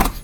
Sound effects > Other mechanisms, engines, machines
metal shop foley -223
bam, bang, boom, bop, foley, metal, oneshot, pop, rustle, sfx, shop, sound, thud, tools